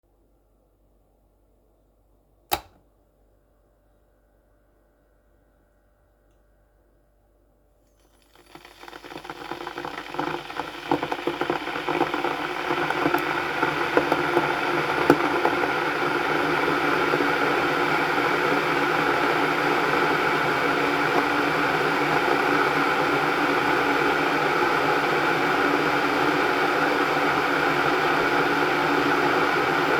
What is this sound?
Sound effects > Objects / House appliances
Electric kettle bought to boil, picked up, and used for tea
kettle, kitchen